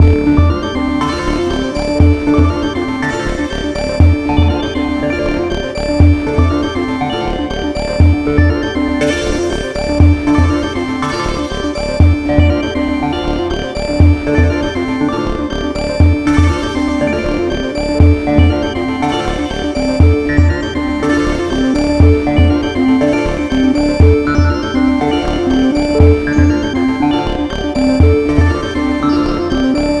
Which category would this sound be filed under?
Music > Multiple instruments